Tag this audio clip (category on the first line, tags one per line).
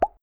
Soundscapes > Nature
Pop
Water